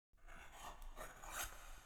Sound effects > Other
slide stone long 1
cave, move, stone